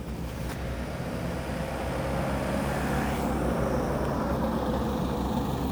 Soundscapes > Urban
voice 14-11-2025 8 car
Car, vehicle